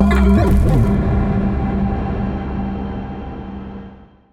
Instrument samples > Synths / Electronic
CVLT BASS 42
bass, bassdrop, clear, drops, lfo, low, lowend, stabs, sub, subbass, subs, subwoofer, synth, synthbass, wavetable, wobble